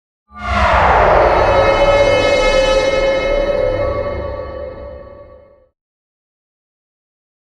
Sound effects > Other
Dark textures SFX 021
All samples used in the production of this sound effect are recorded by me. I used ASM Hydrasynth Deluxe to design this effect, post-production was done in REAPER DAW.
abstract, ambient, atmospheric, creepy, dark, distorted, drone, eerie, effects, evolving, gloomy, granular, horror, mysterious, ominous, pad, sfx, sound, soundscape, textures, unsettling